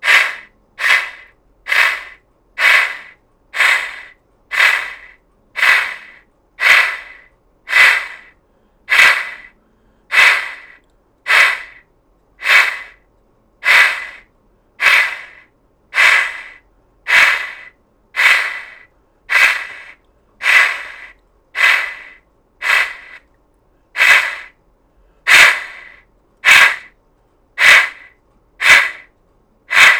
Sound effects > Objects / House appliances
Steam chuffs, poofs or dragon puffs simulated using an Acme Windmaster.